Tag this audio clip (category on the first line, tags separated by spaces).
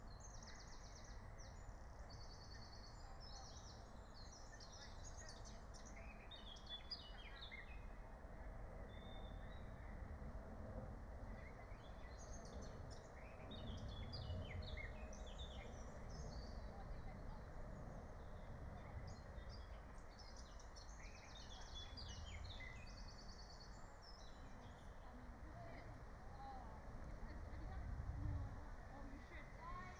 Soundscapes > Nature
alice-holt-forest field-recording soundscape nature